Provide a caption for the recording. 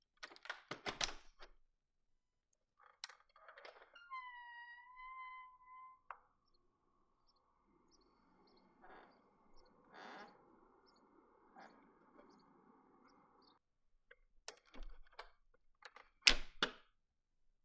Sound effects > Objects / House appliances
Our balcony door opening and closing